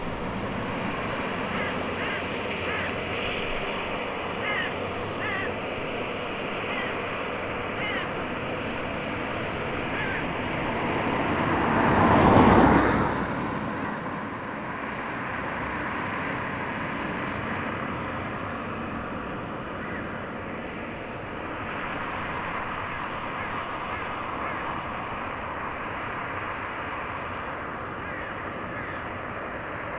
Sound effects > Vehicles
Car drives past. Crows in the background.
automobile, car, crows, drive, engine, vehicle